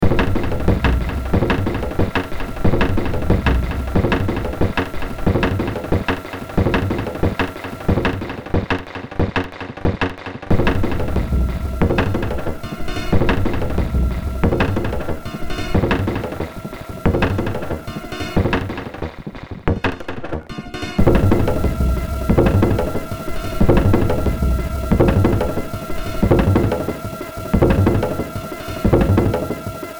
Music > Multiple instruments
Short Track #2944 (Industraumatic)
Ambient, Cyberpunk, Games, Horror, Industrial, Noise, Sci-fi, Soundtrack, Underground